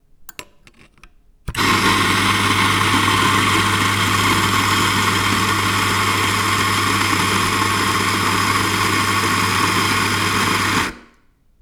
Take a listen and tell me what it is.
Sound effects > Objects / House appliances
Coffee Grinder Machine Italian Eureka
The sound of my amazing Eureka coffee grinder machine
beans, coffee, espresso, grinder, grinding